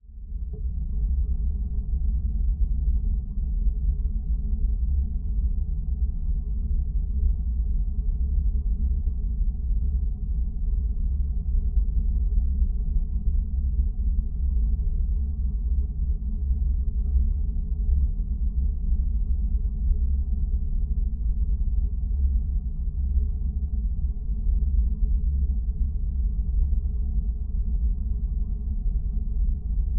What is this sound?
Sound effects > Other mechanisms, engines, machines
LOM Geofon attached to a ferry metal railing on Mediterranean Sea. Recorded with a Tascam FR-AV2